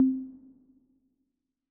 Sound effects > Electronic / Design
Made with the Vital synth in FL Studio — [SFX: Failed]. Designed for casual games.
casual, fail, pad, videogames